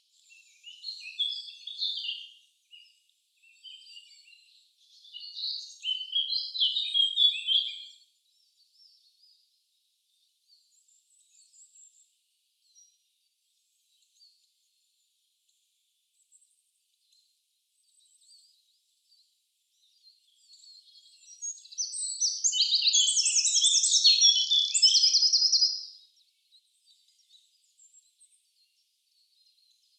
Soundscapes > Nature
A recording of various birds from Macclesfield Forest. Edited using RX11.
birds, field-recording, forest, nature